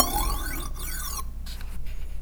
Sound effects > Objects / House appliances
knife and metal beam vibrations clicks dings and sfx-067
Beam, Clang, Metal, metallic, ting, Vibrate, Wobble